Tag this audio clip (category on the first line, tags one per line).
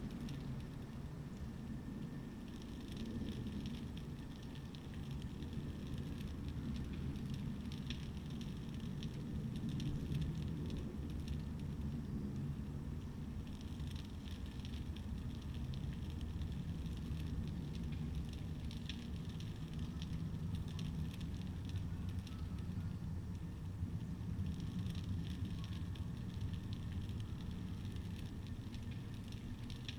Soundscapes > Nature
weather-data
natural-soundscape